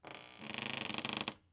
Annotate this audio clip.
Sound effects > Objects / House appliances
wooden door creak9
A series of creaking sounds from some old door recorded with I don't even know what anymore.
creak, creaking, door, foley, horror, old-door, wooden